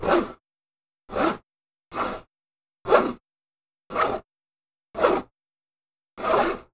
Sound effects > Objects / House appliances
SWSH-Blue Snowball Microphone, CU Swishes, Simulated Using Nails On Plastic Tray 01 Nicholas Judy TDC
Swishes. Simulated using fingernails scratching a plastic tray.
Blue-brand, Blue-Snowball, cartoon, foley, swish